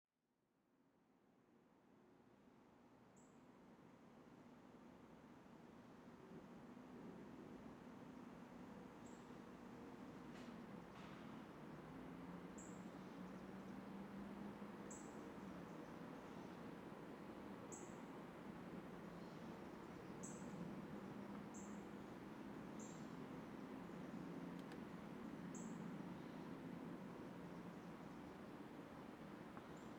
Soundscapes > Nature
A late afternoon thunderstorm recorded by my condo's deck. The audio starts off quiet with a few chirping birds, light drizzle, and thunder rumbles. Things begin to intensify at the #7:22 mark with some loud thunder. A "ground zero jump scare" thunder blast hits at the #10:07 mark. The rain starts at the #11:00 minute mark. At the #12:00 minute mark the rain intensifies and it gets very windy. Thunder is still heard but it's not as loud as that "jump scare" blast. My wind chimes can be heard at times but their sound is a bit muted from being waterlogged. The recording could have been longer but I had to stop. Wind driven rain was coming into the condo unit! Close the screen door and towel dry the affected carpet. This was recorded with my usual Zoom H6 Essential. Audio edited in AVS Audio editor.
field-recording, rain, rainstorm, thunder, thunderstorm, weather, wind